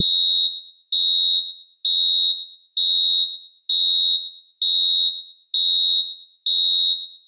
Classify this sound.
Sound effects > Objects / House appliances